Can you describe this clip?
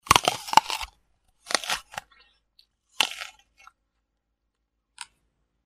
Sound effects > Human sounds and actions
Comiendo manzana/biting into an apple
Una captura cercana de una persona mordiendo una manzana. A close-up shot of a person biting into an apple
manzana, Apple, bite, comiendo, fruit